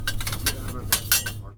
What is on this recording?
Sound effects > Objects / House appliances
Junkyard Foley and FX Percs (Metal, Clanks, Scrapes, Bangs, Scrap, and Machines) 23
Ambience, Atmosphere, waste, Bash, rattle, FX, tube, dumping, Machine, Robot, Junk, Bang, Metallic, Metal, trash, Clank, Robotic, Smash, Foley, Clang, Environment, rubbish, dumpster, Perc, scrape, garbage, Dump, SFX, Junkyard, Percussion